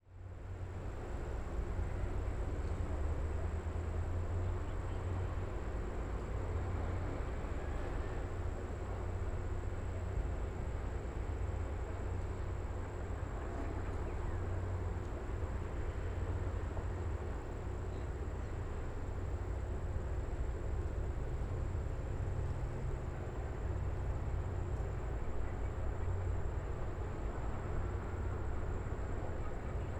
Soundscapes > Urban
250823 100823 PH Harbour from a hill
Harbour from a hill. (Take 1) I made this recording in the morning, from a hill located near the harbour of Calapan city (Oriental Mindoro, Philippines). One can hear the hum of the harbour, with machines and distant voices, as well as insects, birds, and some wind at times. Recorded in August 2025 with a Zoom H5studio (built-in XY microphones). Fade in/out applied in Audacity.